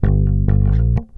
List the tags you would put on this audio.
Instrument samples > String
fx
slide
mellow
blues
funk
plucked
charvel
rock
oneshots
riffs
bass
loop
pluck
electric
loops

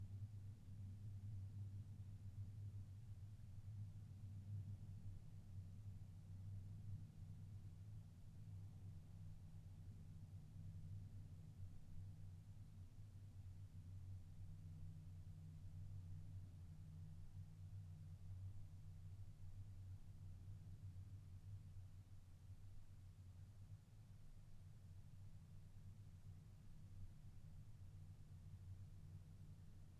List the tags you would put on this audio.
Soundscapes > Nature
field-recording
data-to-sound
nature
alice-holt-forest
modified-soundscape
phenological-recording
soundscape
weather-data
natural-soundscape
raspberry-pi
sound-installation
Dendrophone
artistic-intervention

